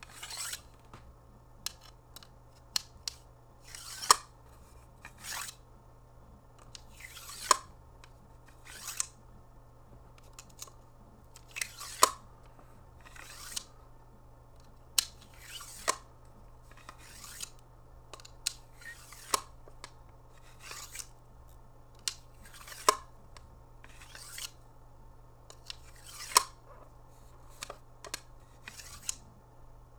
Sound effects > Objects / House appliances
FOLYProp-Blue Snowball Microphone Chopsticks Case, Slide, Open, Close Nicholas Judy TDC
A chopstick case sliding open and closed.
chopstick, Blue-Snowball, case, slide, close, Blue-brand, open, foley